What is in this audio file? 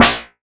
Instrument samples > Synths / Electronic
SLAPMETAL 2 Bb
additive-synthesis, bass, fm-synthesis